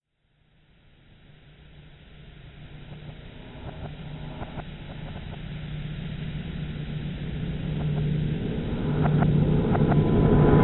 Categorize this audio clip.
Sound effects > Experimental